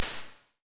Instrument samples > Synths / Electronic
IR (Analog Device) - Late 90s Soundcraft Signature 12 - ROOM
That device is noisy, but these are Soundcraft Signature 12 inbuilt reverbs :) Impulse source was 1smp positive impulse. Posting mainly for archival, but I will definetly use these!!